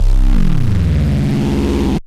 Instrument samples > Synths / Electronic
CVLT BASS 171
bass, drops, lfo, low, lowend, stabs, sub, subbass, subs, subwoofer, wavetable, wobble